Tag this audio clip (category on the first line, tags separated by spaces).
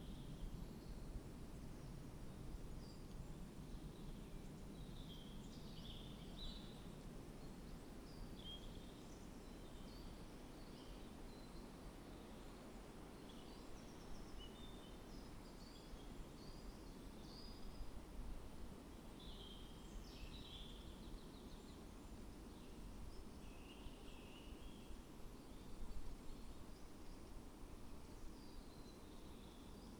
Soundscapes > Nature
nature natural-soundscape data-to-sound Dendrophone raspberry-pi alice-holt-forest sound-installation artistic-intervention field-recording modified-soundscape weather-data phenological-recording soundscape